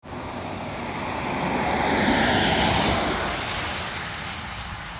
Sound effects > Vehicles
Car driving in Tampere. Recorded with iphone in fall, humid weather.
auto, car, city, field-recording, street, traffic